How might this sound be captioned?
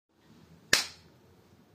Sound effects > Human sounds and actions
high-clap-IK
High clap between two persons.
hands, clap, highclap